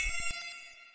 Sound effects > Experimental
Glitch Percs 21 robomouse
clap, crack, edm, experimental, fx, glitch, glitchy, hiphop, idm, impact, impacts, laser, lazer, otherworldy, perc, sfx, snap, zap